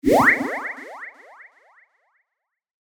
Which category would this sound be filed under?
Sound effects > Electronic / Design